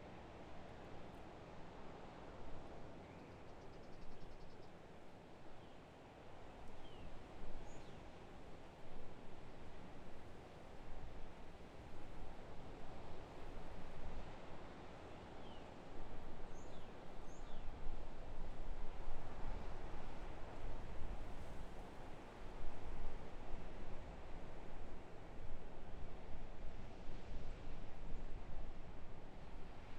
Soundscapes > Nature
Wind, Distant Sea, Palm Leaves, Birds
birds, Distant, field-recording, italy, leaves, nature, Palm, sand, Sea, soundscapes, wind